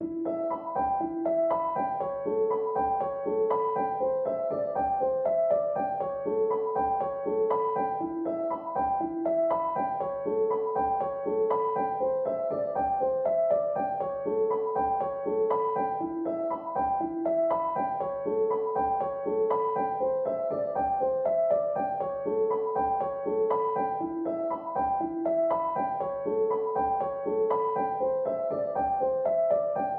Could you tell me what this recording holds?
Music > Solo instrument
Piano loops 190 octave up short loop 120 bpm
reverb, piano, pianomusic, simplesamples, music, loop, 120, samples, 120bpm, free, simple